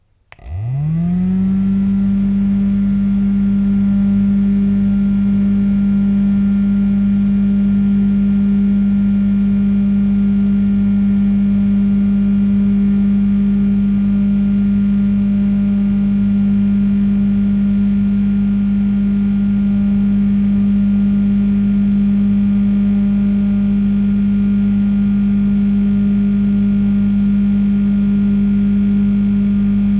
Sound effects > Objects / House appliances
Personal battery powered fan - 1
Subject : A small personal usb c battery powered fan. 4 Bladed about 5cm blade to blade. Date YMD : 2025 July 23 Early morning. Location : France indoors. Sennheiser MKE600 with stock windcover P48, no filter. Weather : Processing : Trimmed and normalised in Audacity.
4-blade, air, buzz, Fan, FR-AV2, hum, humm, Hypercardioid, MKE-600, MKE600, near, noise, Sennheiser, Shotgun-mic, Shotgun-microphone, Single-mic-mono, Tascam